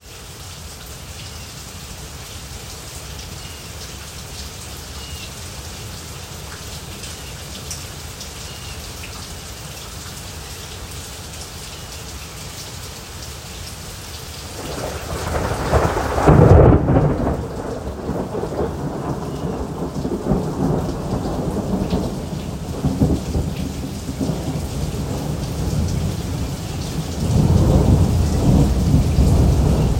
Sound effects > Natural elements and explosions
Rain & Lightning
Rain recorded from a porch with occasional lightning and thunder.